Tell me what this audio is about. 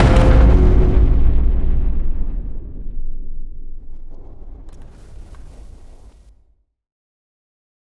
Sound effects > Other
Sound Design Elements Impact SFX PS 050
audio, blunt, cinematic, collision, crash, design, effects, explosion, force, game, hard, heavy, hit, impact, percussive, power, rumble, sfx, sharp, shockwave, smash, sound, strike, thudbang, transient